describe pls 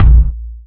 Instrument samples > Percussion
fat kick 1
A quality kick for avant-garde metal, rock, and jazz.
rhythm, death-metal, attack, headsound, thrash-metal, bass, fat-drum, fatdrum, fat-kick, pop, percussive, mainkick, groovy, thrash, forcekick, rock, trigger, kick, bassdrum, Pearl, percussion, bass-drum, headwave, hit, beat, natural, drums, drum, metal, fatkick